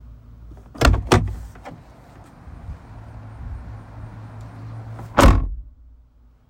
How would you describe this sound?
Sound effects > Vehicles
Jeep Door Open-Close
Jeep Wrangler Sahara door is opened and closed.
Automobile, Open, Jeep, Door, Vehicle, Close